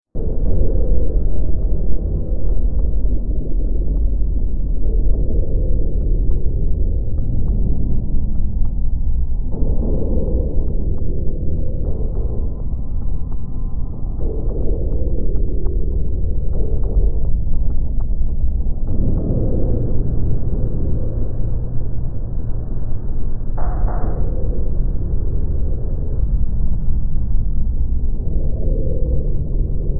Soundscapes > Synthetic / Artificial
Looppelganger #201 | Dark Ambient Sound
Use this as background to some creepy or horror content.
Ambience, Ambient, Darkness, Drone, Games, Gothic, Hill, Horror, Noise, Sci-fi, Silent, Soundtrack, Survival, Underground, Weird